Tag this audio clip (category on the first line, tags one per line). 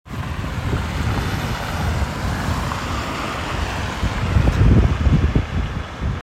Sound effects > Vehicles
car
outside